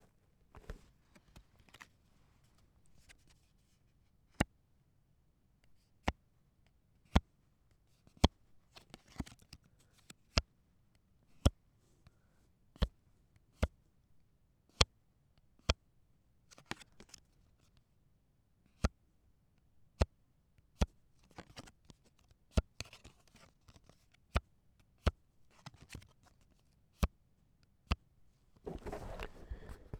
Sound effects > Objects / House appliances
Flicking a playing card, using the Zoom H2 Handy recorder from under a heavy blanket to avoid reverbs. Use it for whatever you want :)